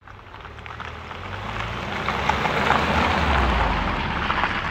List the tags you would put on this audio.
Sound effects > Vehicles
car
combustionengine
driving

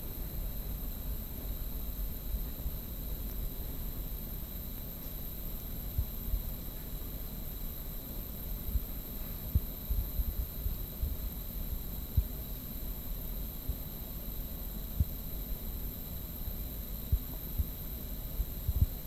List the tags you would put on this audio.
Sound effects > Objects / House appliances
burner
fire
flame
gas
stove

